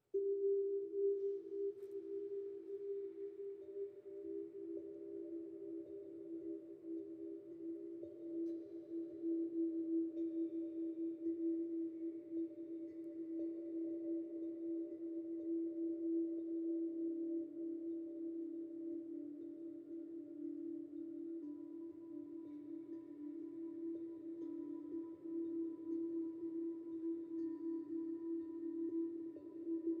Music > Solo percussion
Ambient tongue drum song.